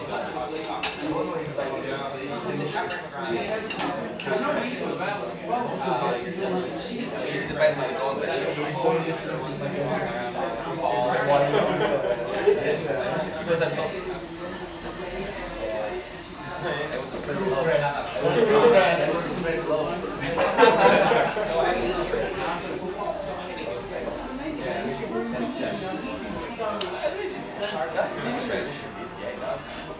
Soundscapes > Urban
Irish Pub
A small pub in Irland